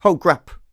Speech > Solo speech
Surprised - Ho crap
Subject : A mid20s male voice-acting for the first time. Check out the pack for more sounds. Objective was to do a generic NPC pack. Weather : Processing : Trimmed and Normalized in Audacity, Faded in/out. Notes : I think there’s a “gate” like effect, which comes directly from the microphone. Things seem to “pop” in. Also sorry my voice-acting isn’t top notch, I’m a little monotone but hey, better than nothing. I will try to do better and more pronounced voice acting next time ;) Tips : Check out the pack!